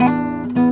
Music > Solo instrument
Acoustic Guitar Oneshot Slice 72
plucked, sfx, twang, guitar, string, foley, oneshot, knock, chord, strings, fx, pluck, notes, note, acoustic